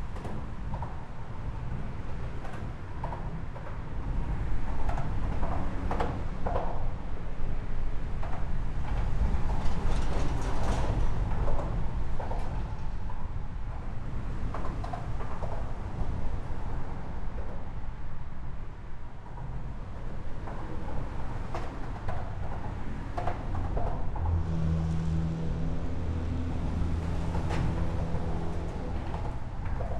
Soundscapes > Urban
AMBTraf Very Busy Traffic Underpass Intermittent Rhythmic Clicking and Thuds from Structure HushAndHarmony
Field recording in an underpass. Dynamic traffic sounds and yeah the title is pretty descriptive. Recorder: ZoomF3 Microphone: Clippy em272 stereo pair enjoy!